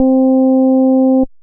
Instrument samples > Synths / Electronic
Yamaha FM-X engine waveform